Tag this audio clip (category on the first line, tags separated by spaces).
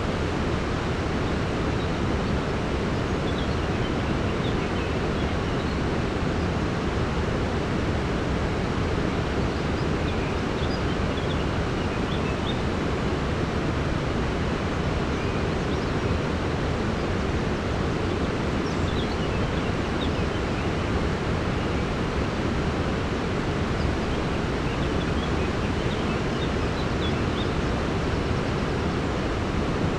Sound effects > Natural elements and explosions
Tascam dam white-noise urbain 2025 hand-held City Albi Early-morning Wind-cover Saturday WS8 Rode Tarn noise 81000 Early Occitanie FR-AV2 France handheld Single-mic-mono Mono NT5 morning water Outdoor